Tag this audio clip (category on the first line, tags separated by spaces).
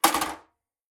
Sound effects > Other mechanisms, engines, machines
Blade Chopsaw Circularsaw Foley FX Metal Metallic Perc Percussion Saw Scrape SFX Shop Teeth Tool Tools Tooth Woodshop Workshop